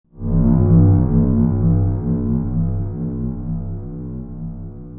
Sound effects > Electronic / Design
Alien Airplane
Made in furnace tracker using an FM sound and passing it in low-filter.
alien-sound-effects, FM, Alien